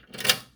Sound effects > Objects / House appliances
inserting key 1
Here is a sound of me inserting a small key into a small container.
Insert, metal, key, metallic